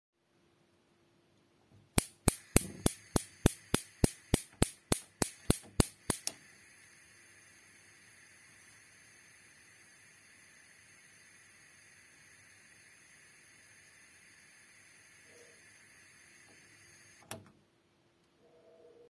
Sound effects > Objects / House appliances
Turning on a gas stove, leaving the flame for a bit and then turning it off
turn-on, cooker, stove, cook, cooking, gas-stove, kitchen, flame, burner, gas